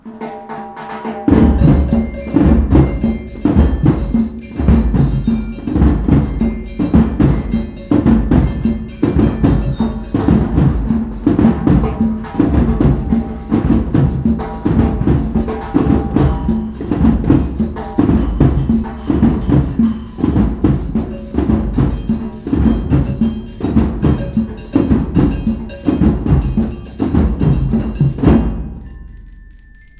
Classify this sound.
Soundscapes > Urban